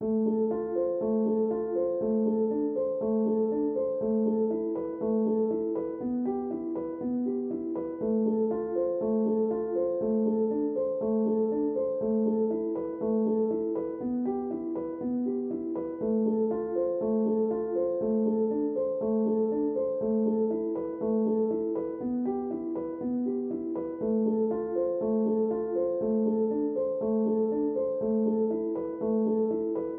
Music > Solo instrument
Piano loops 194 octave down short loop 120 bpm
loop, simple, 120, free, piano, pianomusic, 120bpm, reverb, samples, music, simplesamples